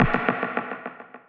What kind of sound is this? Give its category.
Instrument samples > Synths / Electronic